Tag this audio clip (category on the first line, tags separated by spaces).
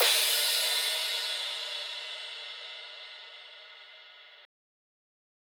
Instrument samples > Percussion
1-shot cymbal drum drums velocity